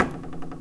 Sound effects > Other mechanisms, engines, machines
Handsaw Beam Plank Vibration Metal Foley 2
twang, shop, percussion, saw, handsaw, vibration, vibe, household, smack, foley, sfx, tool, metallic, twangy, metal, perc, fx, plank, hit